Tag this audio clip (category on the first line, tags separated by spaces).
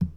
Sound effects > Objects / House appliances
bucket carry clang clatter cleaning container debris drop fill foley garden handle hollow household kitchen knock lid liquid metal object pail plastic pour scoop shake slam spill tip tool water